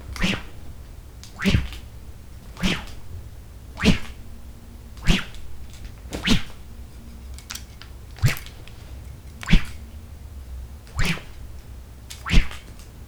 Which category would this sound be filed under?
Sound effects > Natural elements and explosions